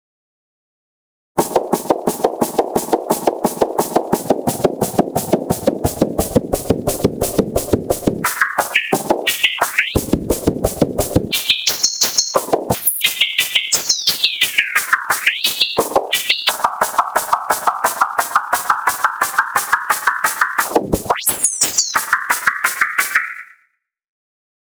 Music > Solo percussion
Simple Bass Drum and Snare Pattern with Weirdness Added 048
Bass-and-Snare, Bass-Drum, Experimental, Experimental-Production, Experiments-on-Drum-Beats, Experiments-on-Drum-Patterns, Four-Over-Four-Pattern, Fun, FX-Drum, FX-Drum-Pattern, FX-Drums, FX-Laden, FX-Laden-Simple-Drum-Pattern, Glitchy, Interesting-Results, Noisy, Silly, Simple-Drum-Pattern, Snare-Drum